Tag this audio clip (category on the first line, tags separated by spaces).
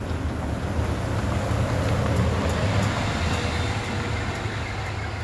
Sound effects > Vehicles
Tram Vehicle